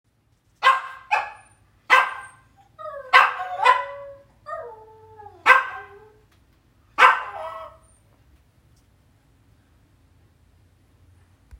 Sound effects > Animals
This is the sound of a 10 year-old small, male Poodle/Chihuahua mix that is whining. Another dog can be heard sympathetically whining periodically.